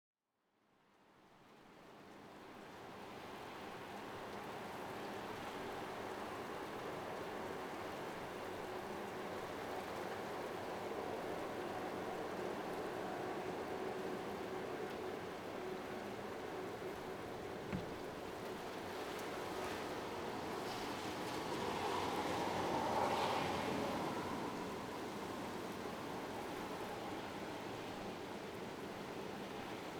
Soundscapes > Other
An evening recording from a semi-open window perspective.